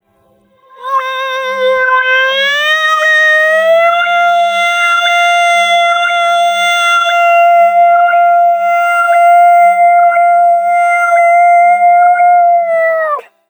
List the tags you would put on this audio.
Speech > Processed / Synthetic
glitchy
vocal
atmosphere
spooky
strange
sound-design
shout
monster
howl
sfx
alien
wtf
animal
fx
dark
weird
glitch
processed
growl
vocals
otherworldly
sounddesign
pitch
abstract
reverb
effect
vox

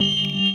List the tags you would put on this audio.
Sound effects > Electronic / Design
alert
message
confirmation
digital
interface
selection

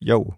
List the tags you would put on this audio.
Speech > Solo speech
greetings
yo
Male
Voice-acting
Hypercardioid
MKE600

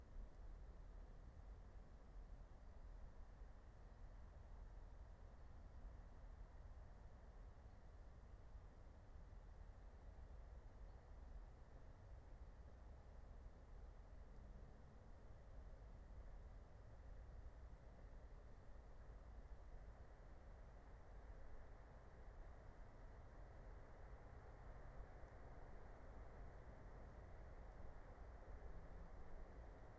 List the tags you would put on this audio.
Soundscapes > Nature
soundscape
nature
phenological-recording
meadow
natural-soundscape
alice-holt-forest
raspberry-pi
field-recording

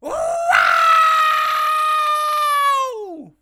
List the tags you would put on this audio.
Speech > Solo speech
cartoon
male
man
pain
scream
yell

